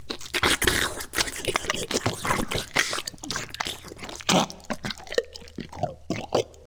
Sound effects > Experimental
Creature Monster Alien Vocal FX (part 2)-027
Monster, weird, demon, Sfx, fx, gross, Creature, growl, bite, snarl, devil, otherworldly, zombie, grotesque, dripping, mouth, howl, Alien